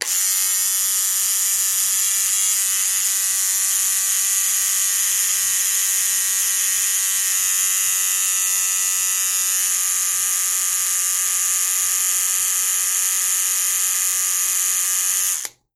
Sound effects > Objects / House appliances
An Oral-B electric toothbrush turning on, running and turning off.